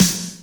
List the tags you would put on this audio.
Instrument samples > Percussion
80s-drum
1ovewav
snare
80s
drum
analog
1-shot

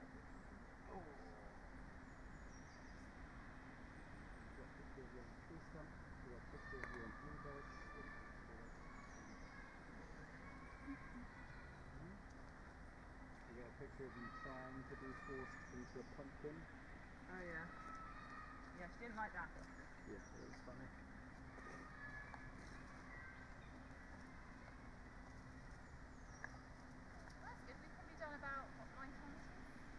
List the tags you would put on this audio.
Soundscapes > Nature
artistic-intervention
data-to-sound
field-recording
modified-soundscape
nature
phenological-recording
raspberry-pi
sound-installation
soundscape
weather-data

